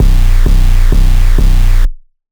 Sound effects > Electronic / Design
Heavely crushed bass
Made in FL Studiо, plugins used: FLEX 808 blasted
Bass, Crush